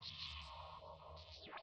Soundscapes > Synthetic / Artificial
LFO Birdsong 14
birds, massive, Lfo